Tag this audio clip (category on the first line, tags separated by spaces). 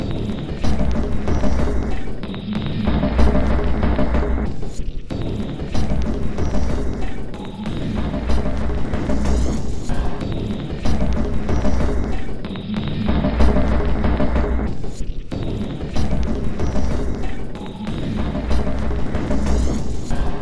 Instrument samples > Percussion
Underground Alien